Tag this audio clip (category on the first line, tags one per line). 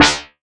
Instrument samples > Synths / Electronic
fm-synthesis
bass
additive-synthesis